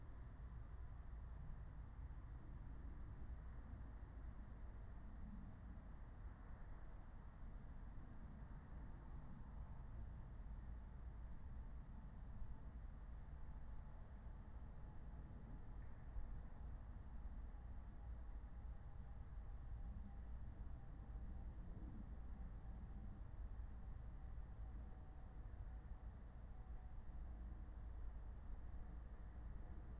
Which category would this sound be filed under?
Soundscapes > Nature